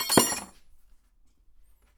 Sound effects > Other mechanisms, engines, machines
metal shop foley -093
bam, bang, boom, bop, crackle, foley, fx, knock, little, perc, percussion, rustle, sfx, shop, sound, thud, tink, tools, wood